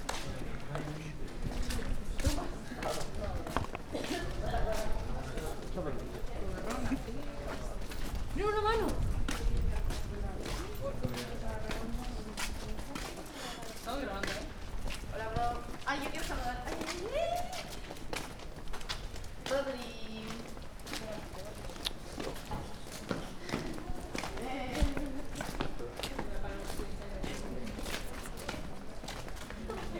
Sound effects > Human sounds and actions
20250326 CaminataSonoraSantAndreu Humans Steps Complex
Urban Ambience Recording in collab with La Sagrera Institute, Barcelona, January 2025. Using a Zoom H-1 Recorder.